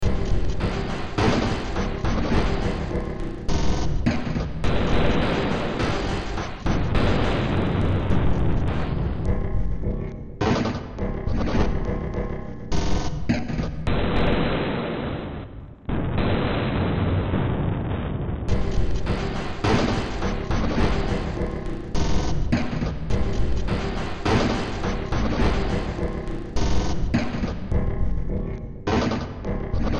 Music > Multiple instruments
Demo Track #3208 (Industraumatic)
Games, Cyberpunk, Noise, Horror, Soundtrack, Underground, Industrial, Sci-fi, Ambient